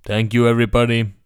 Speech > Solo speech
thank you everybody
calm, human, speech, voice